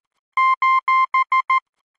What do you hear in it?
Sound effects > Electronic / Design
Morse Colon
A series of beeps that denote the colon in Morse code. Created using computerized beeps, a short and long one, in Adobe Audition for the purposes of free use.